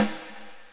Music > Solo percussion
Snare Processed - Oneshot 119 - 14 by 6.5 inch Brass Ludwig
perc, sfx, drumkit, crack, kit, acoustic, roll, hits, rimshot, fx, snaredrum, processed, drum, flam, percussion, oneshot, ludwig, rimshots, reverb, realdrums, snareroll, snare, rim, realdrum, brass, snares, beat, hit, drums